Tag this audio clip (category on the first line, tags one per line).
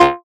Instrument samples > Synths / Electronic
additive-synthesis
fm-synthesis
bass